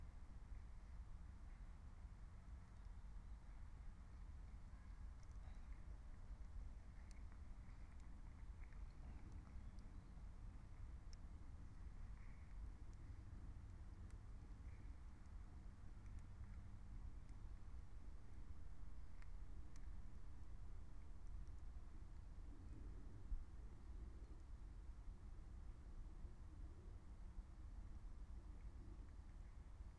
Soundscapes > Nature
Automatic recording from a wood near Alice Holt Lodge Pond, Surrey, UK. Recorded with a DIY Raspberry Pi audio streamer designed by Luigi Marino. Before Feb 28th 2025, the recordings were done using MEMs microphones. Since Feb 28th 2025, the quality of the recordings has improved considerably because of changes in the equipment, including switching to Rode LavalierGO mics with Rode AI-Micro audio interface and software updates. This solar-powered system is typically stable, but it may go offline due to extreme weather factors. This recording is part of a natural soundscape dataset captured four times a day according to solar time (sunrise, solar noon, sunset, and at the midpoint between sunset and sunrise). The main tree species is Corsican pine, planted as a crop in 1992, and there are also mixed broadleaf species such as oak, sweet chestnut, birch, and willow. The animal species include roe deer, muntjac deer, and various birds, including birds of prey like buzzard and tawny owl.
natural-soundscape, meadow, phenological-recording, soundscape, alice-holt-forest, field-recording, raspberry-pi, nature